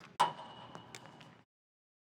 Sound effects > Other
Ice Hockey Sound Library Post2
Ringing a shot off the goal post.